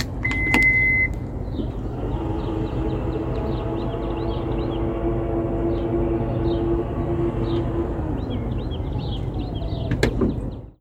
Sound effects > Vehicles
VEHMech-Samsung Galaxy Smartphone, CU Automatic Trunk, Close Nicholas Judy TDC
An automatic trunk closing. Birdsong in background.
automatic, car, close, Phone-recording, trunk